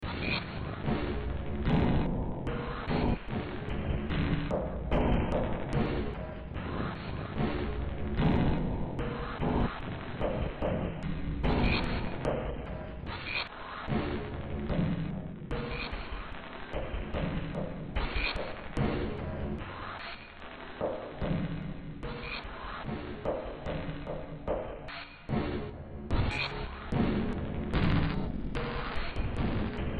Music > Multiple instruments
Demo Track #3678 (Industraumatic)
Cyberpunk, Noise